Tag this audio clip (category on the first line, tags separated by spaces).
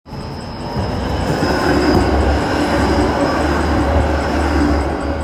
Sound effects > Vehicles
city public-transport tram